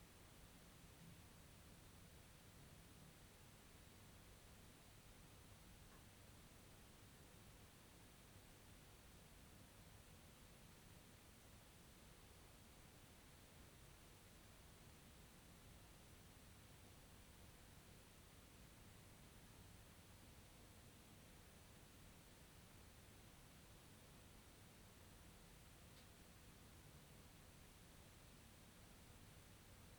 Soundscapes > Indoors
Subject : Recording the wooden floor from under it. Cats living there. Date YMD : 2025 October 31 early morning 06h16 Location : Albi 81000 Tarn Occitanie France. Hardware : Two DJI mic 3 set on the screws of the wooden beams in my basement. Set about 2m appart. Weather : Processing : Trimmed and normalised in Audacity. Notes : There's a vent/opening to the basement, you can hear cars from that way and binmen towards the end. No insulation, there's only the wooden planks between the mic and the other room.